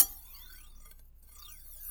Sound effects > Objects / House appliances
knife and metal beam vibrations clicks dings and sfx-069
ding, FX, Perc, Vibrate